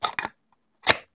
Sound effects > Human sounds and actions
Gun Reload Sound
Sound created by me.
ammo, Gun, Rifle, Shooter, changeammo, Weapon, Reload